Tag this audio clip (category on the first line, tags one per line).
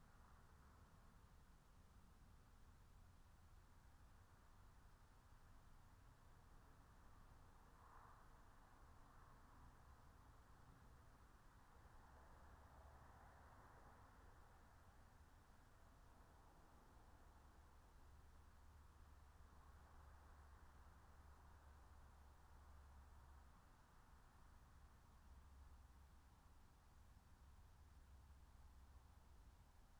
Soundscapes > Nature
soundscape
meadow
raspberry-pi
phenological-recording
field-recording
natural-soundscape
nature
alice-holt-forest